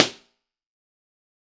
Soundscapes > Other
Subject : Recording a Impulse and response of my bedroom using a omni mic and popping a balloon. Here popping it outside of a blanket fortress I made as a vocal booth. On the opposite side of the room 3/4 length wise. Room dimensions : 4m90 X 3m X 2m70 Date YMD : 2025 July 29 Location : Albi 81000 Tarn Occitanie France. Rode NT5-O Weather : Processing : Trimmed, very short fade-in and a fade-out in Audacity, normalised.